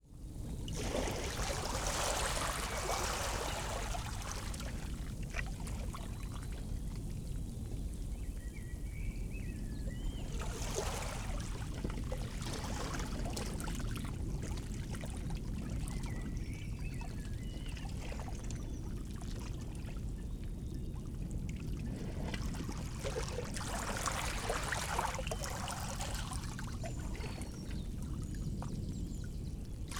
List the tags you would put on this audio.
Soundscapes > Nature
waves tide coast ship sea water ocean surf wash seaside wave beach shore

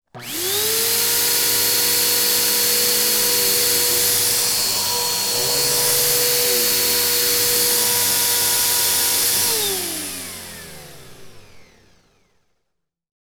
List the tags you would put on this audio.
Sound effects > Objects / House appliances
movie sfx vaccum foley game

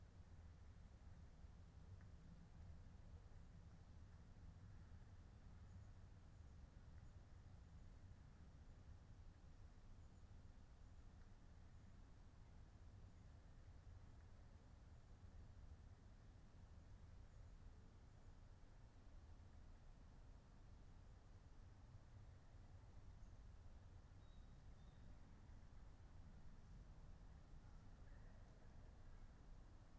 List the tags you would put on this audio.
Soundscapes > Nature
weather-data sound-installation modified-soundscape phenological-recording Dendrophone raspberry-pi soundscape data-to-sound alice-holt-forest field-recording artistic-intervention natural-soundscape nature

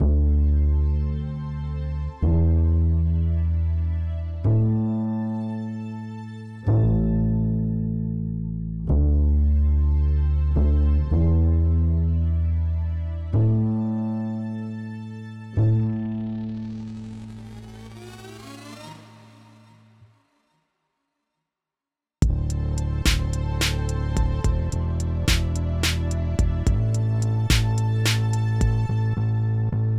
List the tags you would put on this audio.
Music > Multiple instruments
music logic drums bass bpm strings

